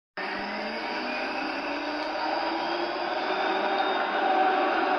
Sound effects > Vehicles
tram accelerating7
Sound of a a tram accelerating from tram stop in Hervanta in December. Captured with the built-in microphone of the OnePlus Nord 4.
field-recording, track, traffic, tram